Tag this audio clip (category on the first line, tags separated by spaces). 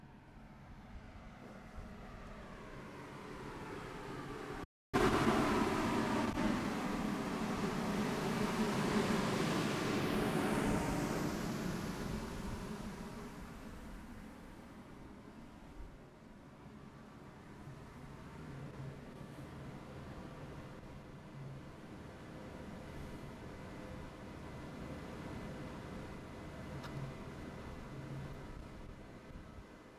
Soundscapes > Urban
urban ventilation ventilator